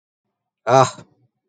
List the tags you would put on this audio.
Sound effects > Other
arabic male sound vocal voice

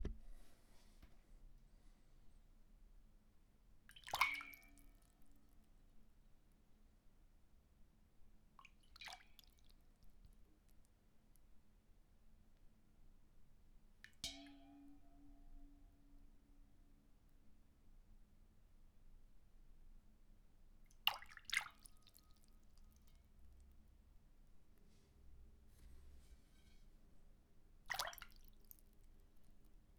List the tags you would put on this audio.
Sound effects > Human sounds and actions
bubble liquid splash swim swimming

